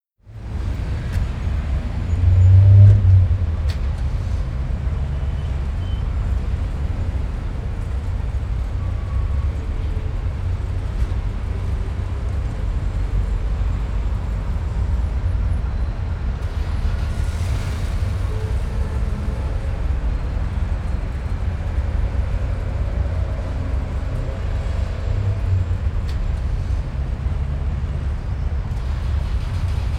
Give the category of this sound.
Sound effects > Vehicles